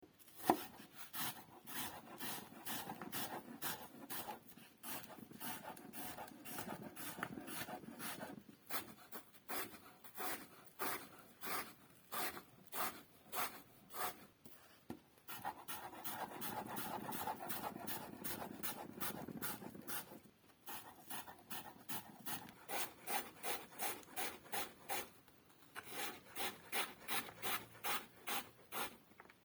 Sound effects > Other
Dicing Apples
Quickly dicing apple on a chopping board Tbh it also sounds like wild scraping...
chop, vegetable, slice, kitchen, slicing, apple, chopping, dicing, scrape, cut, knife, cutting